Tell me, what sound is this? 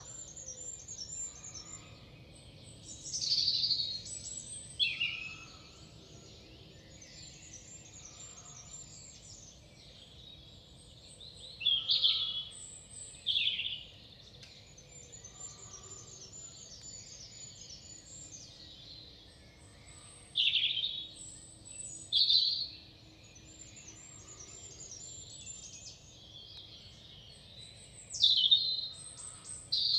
Soundscapes > Nature
Recorded from 05:37 BST, with sunrise being at 05:26 BST. Unbroken recording with nothing removed. This is a suburban residential area with an oak tree nearby. Sounds include: European Robin Common Wood Pigeon Eurasian Magpie Dog barking Recorded with Zoom F1 Essential and Earsight stereo pair mics.